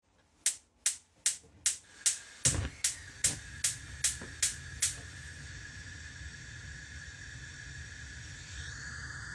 Sound effects > Objects / House appliances
Lighting a Gas Stove/Cooker
The sound of a gas stove being lit. Recorded on August 25th 2025 using a Google Pixel 9a phone. I want to share them with you here.
cook, cooking, Gas, kitchen, retro, stove